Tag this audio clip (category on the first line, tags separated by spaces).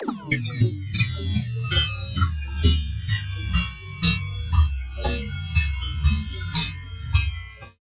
Sound effects > Electronic / Design
Downlifter Downsweep Drop Effect FX